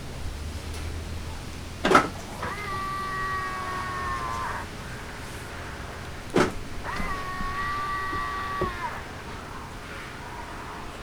Soundscapes > Other
Car Volvo XC40 Ext unlock lock Zoom H1n